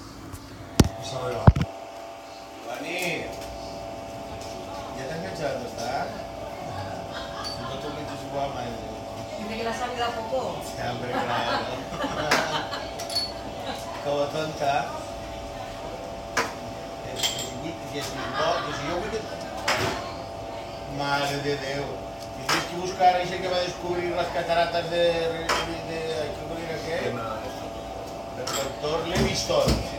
Soundscapes > Indoors
Bar Albuixech
This audio contains sounds inside a bar like plates,forks,voices at Albuixech,Spain.
FORKS, PLATES, SPOONS